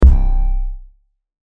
Instrument samples > Synths / Electronic
Synth bass made from scratch Key: C I guess.....